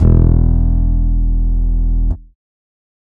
Instrument samples > Synths / Electronic
Synthesized bass sound made using the Atlantis VSTi. Root note: E1 Loop start: 91003 Loop end: 92074